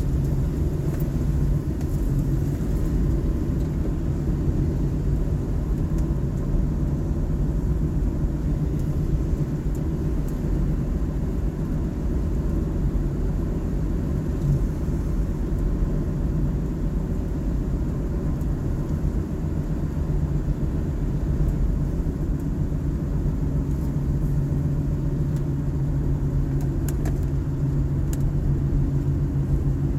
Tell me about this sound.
Soundscapes > Indoors
VEHInt-Samsung Galaxy Smartphone, CU Truck, Driving, Speeding Nicholas Judy TDC
A truck driving and speeding. Interior perspective
drive, interior, truck, Phone-recording, speed